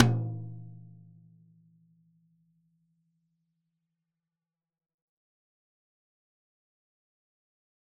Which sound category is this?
Music > Solo percussion